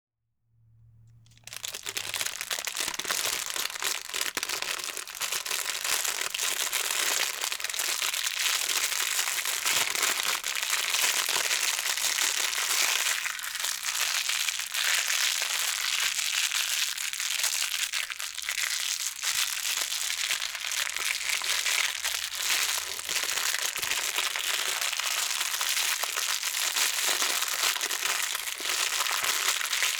Sound effects > Objects / House appliances
OBJPack Cellophane2
Handling and crushing cellophane food packaging by hand in a small office.